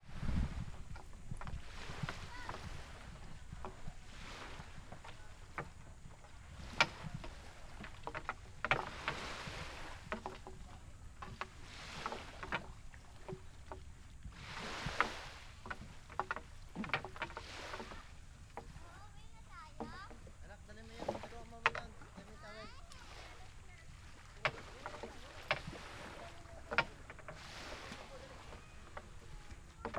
Sound effects > Human sounds and actions
250821 071947 PH Fisherman folding fishnet in bangka
Fisherman folding fishnet in a Bangka. I made this recording in the morning, in Tacligan (near San Teodoro, Oriental Mindoro, Philippines), while a fisherman was folding a fishnet in his Bangka (small wooden outrigger canoe), in order to be ready to go fishing. In the background, sea waves, cicadas, some birds, and sounds from the surroundings. Recorded in August 2025 with a Zoom H5studio (built-in XY microphones). Fade in/out applied in Audacity.
Philippines, sea, beach, voices, men, cicadas, people, fisherman, boat, fish, atmosphere, children, fisher, fishing, fishnet, morning, soundscape, waves, birds, fishermen, Tacligan, village, Bangka, field-recording, ambience